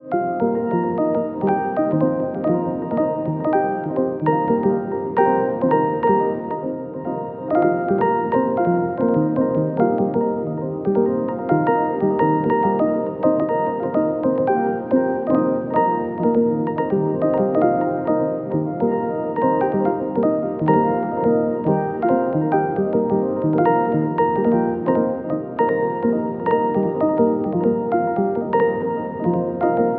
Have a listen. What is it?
Soundscapes > Synthetic / Artificial
Botanica-Granular Ambient 14
Beautiful, Atomosphere